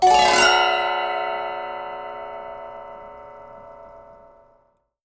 Music > Solo instrument
MUSCPluck-Blue Snowball Microphone, CU Lap Harp, Gliss Up Nicholas Judy TDC
A lap harp glissing up.
Blue-brand, lap-harp